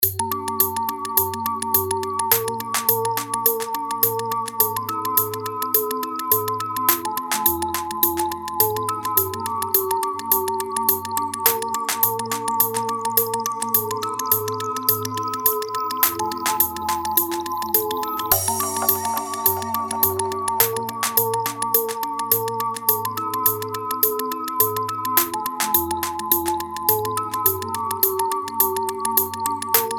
Music > Multiple instruments
Ableton Live. VST.Purity......Musical Composition Free Music Slap House Dance EDM Loop Electro Clap Drums Kick Drum Snare Bass Dance Club Psytrance Drumroll Trance Sample .
Bass, Dance, Kick, Drums, Music, House, Drum, Snare, Composition, Musical, Electro, Free, Loop, EDM, Clap, Slap